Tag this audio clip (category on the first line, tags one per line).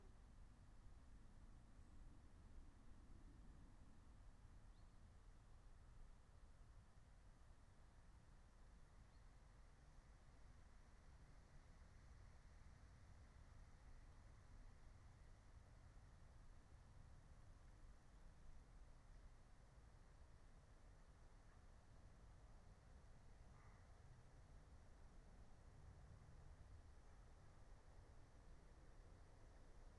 Soundscapes > Nature
alice-holt-forest
natural-soundscape
nature
raspberry-pi
phenological-recording
soundscape
meadow
field-recording